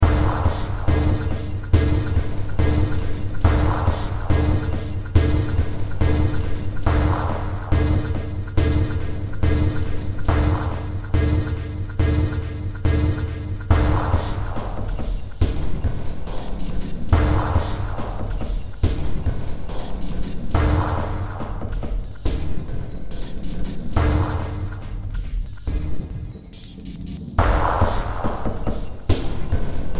Music > Multiple instruments
Short Track #3072 (Industraumatic)
Cyberpunk, Horror, Industrial, Ambient, Underground, Sci-fi, Noise, Games, Soundtrack